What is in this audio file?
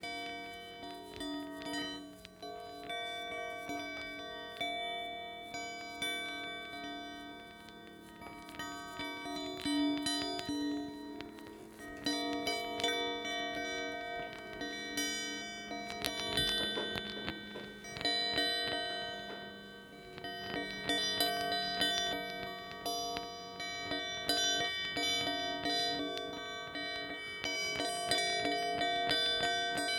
Instrument samples > Other
Baoding Balls - Low - 02 (Long)
baoding, balls